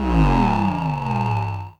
Sound effects > Experimental
Analog Bass, Sweeps, and FX-177
alien, analog, analogue, bass, basses, bassy, complex, dark, fx, machine, robotic, sample, sci-fi, snythesizer, sweep, synth, trippy, vintage, weird